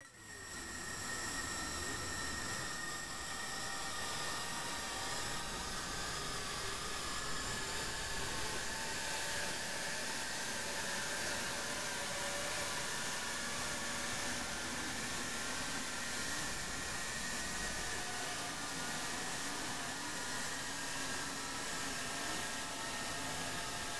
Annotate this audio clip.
Soundscapes > Synthetic / Artificial
Grain Gremlins 1
royalty, effects, free, sample, electronic, sfx, sound, granulator, glitch, experimental, packs, soundscapes, noise, samples